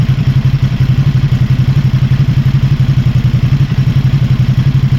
Sound effects > Other mechanisms, engines, machines
puhelin clip prätkä (17)
Supersport, Motorcycle, Ducati